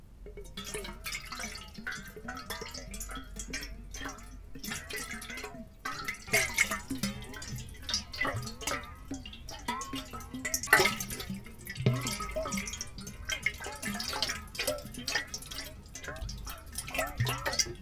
Sound effects > Objects / House appliances
old metal kettle with liquid shaking
Shaking an old, battered metal kettle with shallow water inside, resulting into nice pitch deformations. Recorded with Zoom H2.
kettle, liquid, metal, shaking